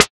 Instrument samples > Synths / Electronic
SLAPMETAL 8 Ab
fm-synthesis, additive-synthesis, bass